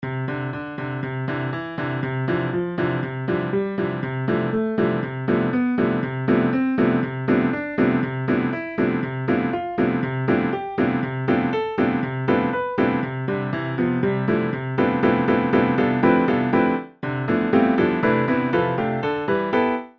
Music > Solo instrument
Piano Sounds 2
pianosounds, piano